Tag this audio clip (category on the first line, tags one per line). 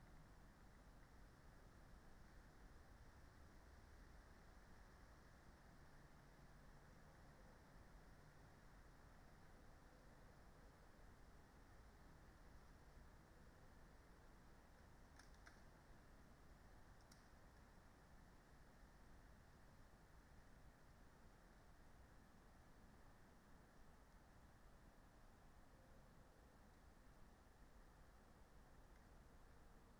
Soundscapes > Nature
soundscape
artistic-intervention
nature
phenological-recording
raspberry-pi
sound-installation
natural-soundscape
field-recording
weather-data
alice-holt-forest
modified-soundscape
data-to-sound
Dendrophone